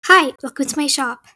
Speech > Solo speech
A female shopkeeper says something. Recorded and edited in Turbowarp Sound Editor